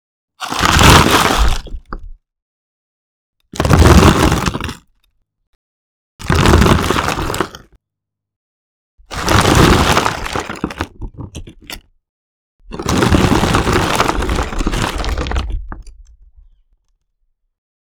Sound effects > Natural elements and explosions
sounds of rock, brick, stone or debris impact falling sounds. inspired by fate stay night heavens feel lost butterfly berserker vs saber alter and lion king 1/2 movie when timon destroyed the tunnels to make a sky light.
avalanche, break, breaking, brick, bricks, crush, debris, dirt, drop, earth, elemental, fall, falling, gravel, hit, impact, movement, pebbles, rock, rocks, rubble, stone, stones